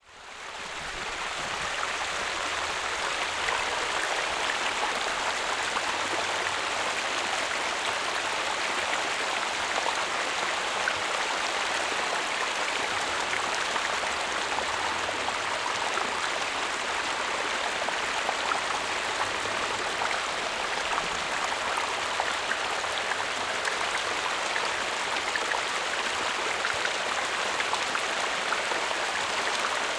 Sound effects > Natural elements and explosions
WATRTurb WATRFlow
Water cascading down rocks in an artifical urban creek. Processed in iZotopeRX then rendered in Reaper
brook, cascade, creek, flow, nature, rocks, sfx, stream, trickle, water